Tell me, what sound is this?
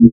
Instrument samples > Synths / Electronic
DISINTEGRATE 8 Bb
fm-synthesis, bass